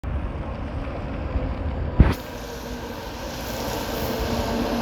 Soundscapes > Urban
A tram passing the recorder in a roundabout. The sound of the tram passing along with some distortion can be heard. Recorded on a Samsung Galaxy A54 5G. The recording was made during a windy and rainy afternoon in Tampere.
city, tram